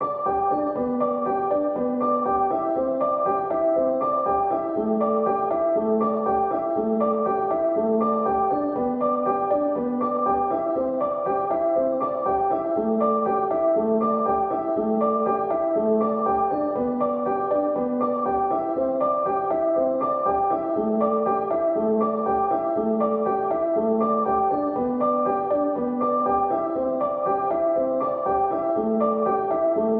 Music > Solo instrument
Piano loops 128 efect 4 octave long loop 120 bpm
reverb, 120bpm, music, simplesamples, pianomusic, loop, free, samples, 120, simple